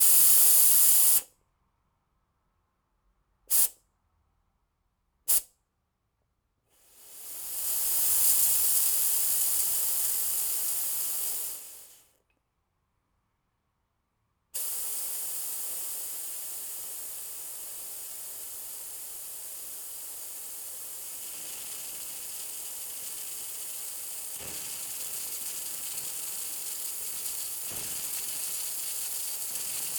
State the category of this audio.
Sound effects > Objects / House appliances